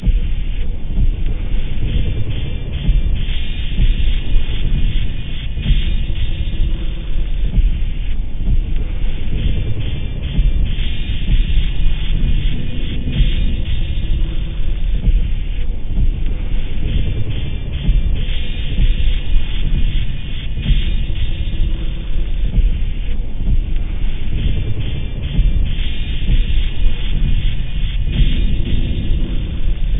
Soundscapes > Synthetic / Artificial
This 64bpm Ambient Loop is good for composing Industrial/Electronic/Ambient songs or using as soundtrack to a sci-fi/suspense/horror indie game or short film.
Alien, Dark, Drum, Loopable, Packs, Samples, Underground, Weird